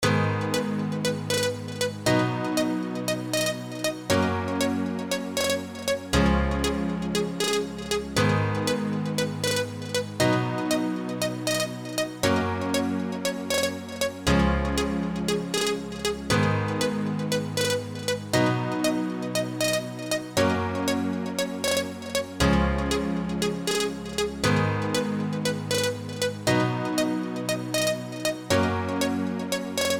Music > Multiple instruments
Ableton Live. VST.Purity......Musical Composition Free Music Slap House Dance EDM Loop Electro Clap Drums Kick Drum Snare Bass Dance Club Psytrance Drumroll Trance Sample .